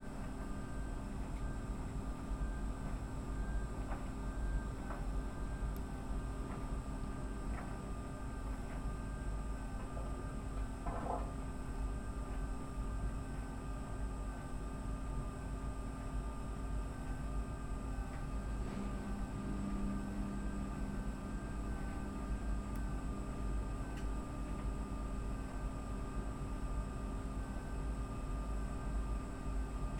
Sound effects > Objects / House appliances
Our dryer doing its job.
appliance, clothes, dryer, laundry, washing